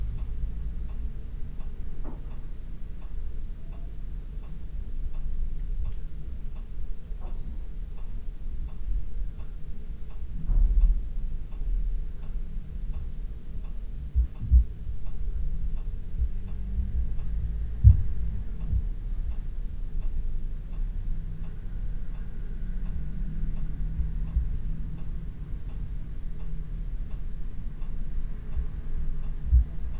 Soundscapes > Indoors
Indoor mic comparison : Rycote OM-08 vs Clippy EM272 – OM-08 version. For my friend Nico and all of you who might be interested, here is a microphone comparison between Rycote OM-08 and Micbooster Clippy EM272. This is the OM-08 version, recorded in a quiet bedroom of a modern flat, with double glazing windows, while kids were running upstairs,. The pulse noise is a Maneki Neko (Japanese cat doll moving its arms, symbolizing good fortune in several Asian cultures). Mics were placed about 36cm apart. Recorded with zoom H5Studio at its full gain.